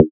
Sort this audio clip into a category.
Instrument samples > Synths / Electronic